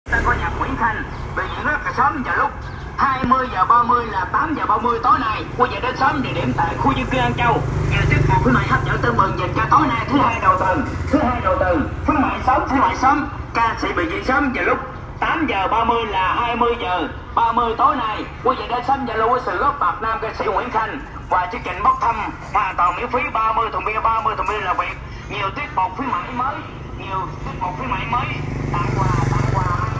Speech > Solo speech
Chân Trọng Mời Đến Khu Dân Cư An Châu 20:30 Giờ
Man drive motorcycle and advertize event in An Châu. Record use iPhone 7 Plus smart phone. 2025 12.29 16:58
voice, advertize, man